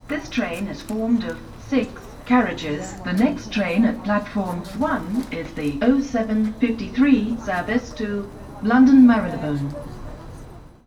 Soundscapes > Urban
A recording at a train station.